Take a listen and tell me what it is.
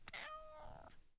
Sound effects > Animals
Mavis cat-meow-soft 01
Soft, whiny cat vocalization.
cat, feline, meow, soft